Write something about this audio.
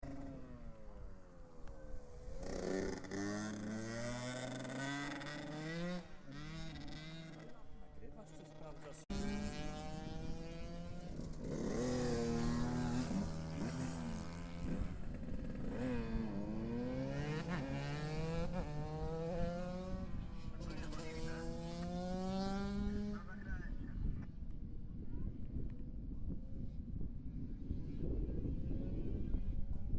Soundscapes > Other
Supermoto Polish Championship - May 2025 - vol.11 - Racing Circuit "Slomczyn"
Recorded on TASCAM - DR-05X; Field recording on the Slomczyn racetrack near Warsaw, PL; Supermoto Championship. I got closed for this recording, near the racetrack entrance to take the sound in, you can hear it moving right to left.
racetrack, motocross, engine, supermoto, tor, warszawa, moto, race, motorcycle, motor, motorbike, smolczyn